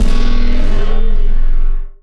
Instrument samples > Synths / Electronic
CVLT BASS 53
bass, bassdrop, clear, drops, lfo, low, lowend, stabs, sub, subbass, subs, subwoofer, synth, synthbass, wavetable, wobble